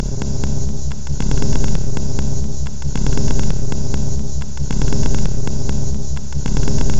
Instrument samples > Percussion
This 137bpm Drum Loop is good for composing Industrial/Electronic/Ambient songs or using as soundtrack to a sci-fi/suspense/horror indie game or short film.
Ambient, Dark, Drum, Industrial, Loop, Loopable, Packs, Samples, Soundtrack, Underground, Weird